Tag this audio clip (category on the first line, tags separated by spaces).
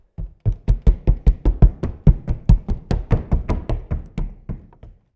Sound effects > Human sounds and actions
Thud impact